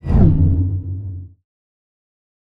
Sound effects > Other
Sound Design Elements Whoosh SFX 019
Effects recorded from the field.
ambient, audio, cinematic, design, dynamic, effect, effects, element, elements, fast, film, fx, motion, movement, production, sound, sweeping, swoosh, trailer, transition, whoosh